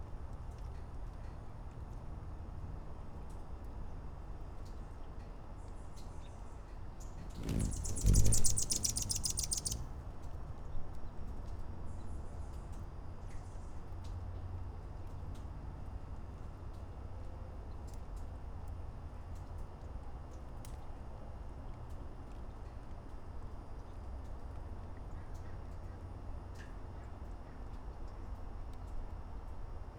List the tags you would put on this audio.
Soundscapes > Urban
bird
birds
field-recording
hummingbird
plane

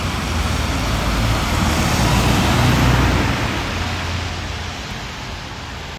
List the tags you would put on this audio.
Soundscapes > Urban
bus
public
transportation

